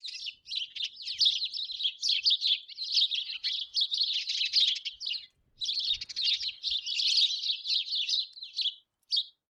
Sound effects > Animals
Robins and finches singing in a bush on an overcast late winters day.